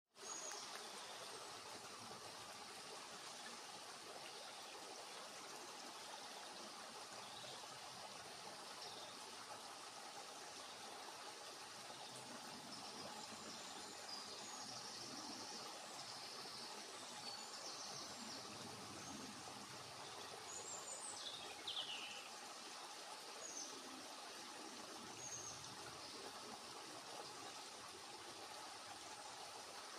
Soundscapes > Nature
Stream in Forest
Small Stream in a Forest
Liquid, Stream, Water